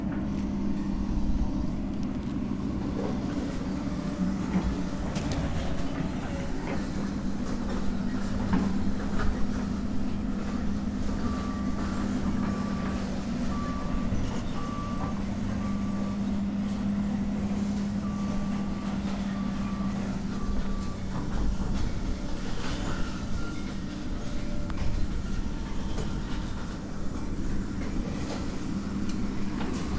Sound effects > Objects / House appliances
Junkyard Foley and FX Percs (Metal, Clanks, Scrapes, Bangs, Scrap, and Machines) 209
Robot, Bang, Clank, Metallic, Perc, scrape, Junkyard, Percussion, dumping, tube, garbage, Machine, Foley, Dump, dumpster, Bash, Ambience, rattle, FX, trash, Metal, SFX, Clang, Junk, rubbish, Robotic, Atmosphere, waste, Smash, Environment